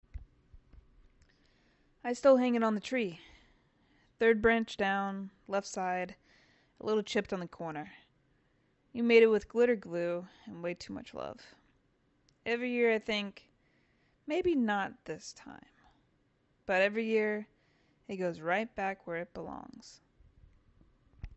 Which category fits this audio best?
Speech > Solo speech